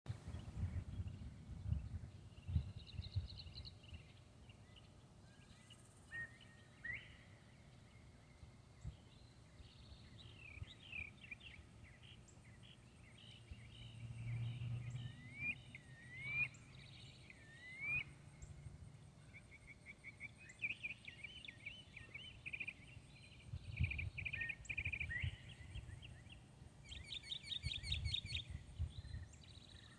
Sound effects > Animals
Various Birds - Hawk's Point Golf Course; Includes Northern Mockingbird, Red-bellied Woodpecker, Male Red-Winged Blackbird, Northern Bobwhite, and Northern Cardinal; Some Insects
Five species of bird calling, as said on the title.
birds, blackbird, bobwhite, cardinal, field-recording, golf, mockingbird, red-bellied-woodpecker, red-winged-blackbird, woodpecker